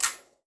Sound effects > Objects / House appliances
MECHLock-Samsung Galaxy Smartphone, CU Combination Lock, Unlocking Nicholas Judy TDC
A combination lock unlocking.